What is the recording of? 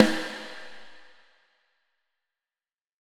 Music > Solo percussion
Snare Processed - Oneshot 34 - 14 by 6.5 inch Brass Ludwig
percussion, drums, reverb, crack, oneshot, beat, rimshot, brass, snaredrum, drum, roll, hit, hits, sfx, flam, snareroll, rimshots, fx, realdrum, kit, realdrums, acoustic, ludwig, snares, rim, processed